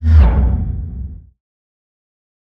Sound effects > Other
Sound Design Elements Whoosh SFX 043
dynamic, film, cinematic, fx, ambient, audio, transition, element, trailer, effects, fast, movement, production, motion, sound, effect, whoosh